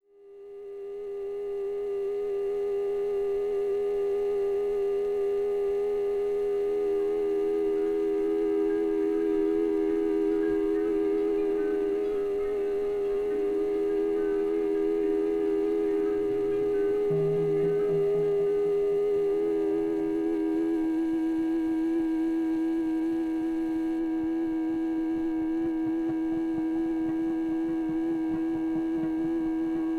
Music > Other
tape drone + guitar sounds medley - droning soundscape
A medley of sounds including random guitar sounds over top a tape drone. The tape drone was made with square waves through a Panasonic RR-830. That and the guitars were recorded with a Zoom H5. Mixed in FL Studio. Reminiscent of something from a late 90s or early 2000s movie or documentary. No drums, could be good as background music or a sample. Could also work for video essays.